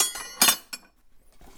Sound effects > Other mechanisms, engines, machines
metal shop foley -082
perc, sfx, bop, shop, thud, rustle, fx, boom, bang, tools, wood, crackle, bam, foley, pop, sound, strike, percussion, tink, oneshot, little, knock, metal